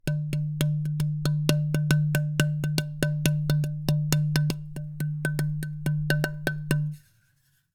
Music > Solo instrument
Marimba Loose Keys Notes Tones and Vibrations 26-001
block, foley, fx, keys, loose, marimba, notes, oneshotes, perc, percussion, rustle, thud, tink, wood, woodblock